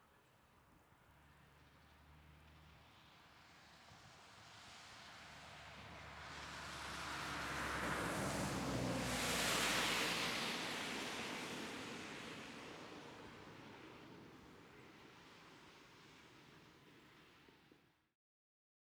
Sound effects > Vehicles
Car passing by in stereo from left to right.